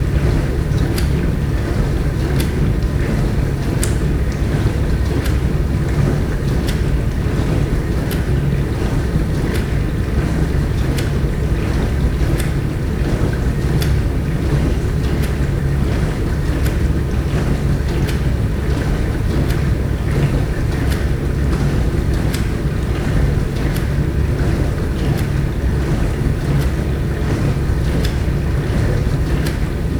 Soundscapes > Indoors
Dishwasher running in the evening. It’s a Bosch dishwasher full of plates and stuff.
water, Dishwasher, wash, washing, washer, machine